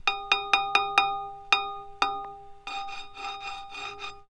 Sound effects > Objects / House appliances
Hitting and rubbing a metallic railing using a contact microphone through a TASCAM DR-05X